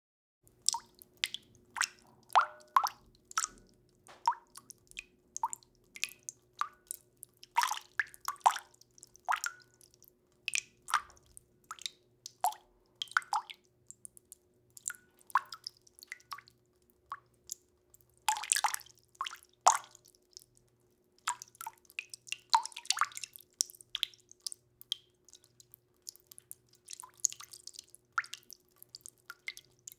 Sound effects > Natural elements and explosions
AAD WATER DRIPPING INTO WATER
Liquid gently poured into a large bowl of liquid. Rode NTG-4 and Zoom F8.
DRIPPING, DROPS, SLOW, WATER, SINGLE